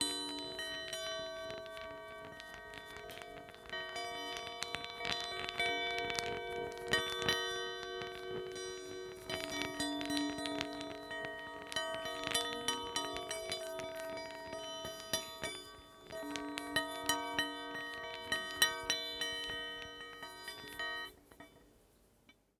Instrument samples > Other
Closely miked recording of Chinese Baoding Balls made in 2018.